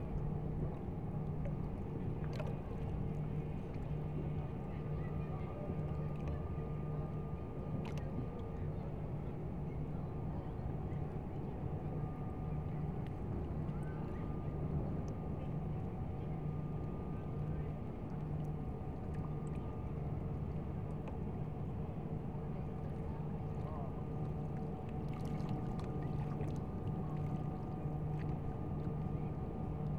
Soundscapes > Nature
East River- Calm Night hellgate bridge Queens New York
East River New York in Astoria Queens. Calm river. Stereo. Field recording using two comica vm40 mics.